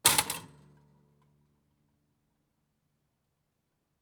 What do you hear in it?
Sound effects > Objects / House appliances
toaster finish
That moment when your toast pops up out of the toaster and you get all excited only to realize that the slice of bread you used is too small and you'll have to spend the next minute getting it out.
househo, toaster, appliance, toast